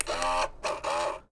Sound effects > Objects / House appliances
MOTRElec-Blue Snowball Microphone, CU Febreze Automatic Air Freshener, No Spray Of Fresh Air Nicholas Judy TDC
A febreze automatic air freshener motor. No spray of fresh air.